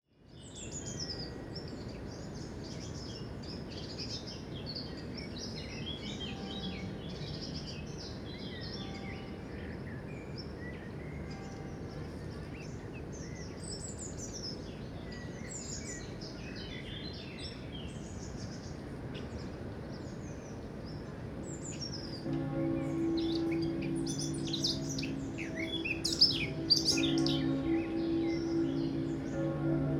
Soundscapes > Urban
BELLLrg Sunday Bells June GILLE GRAZ ReynoldsType4 Binaural 48-24
city, binaural, spatial, Ambisonics, bell, church